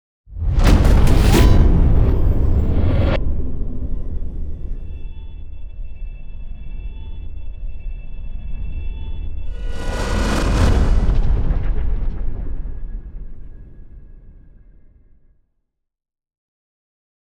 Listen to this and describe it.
Sound effects > Other
Sound Design Elements SFX PS 068
bass, explosion, whoosh, transition, cinematic, stinger, impact, sweep, sub, effect, movement, industrial, riser, reveal, game, indent, implosion, deep, metal, video, tension, epic, trailer, hit, boom